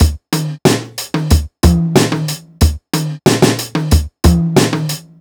Music > Other
hip hop 10 drums 92 bpm
FL studio 9 pattern construction
beat, breakbeat, drum, drumloop, drums, groovy, hiphop, loop, percs, percussion-loop, quantized